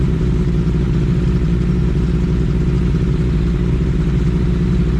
Sound effects > Other mechanisms, engines, machines
clip prätkä (15)
Motorcycle, Supersport, Ducati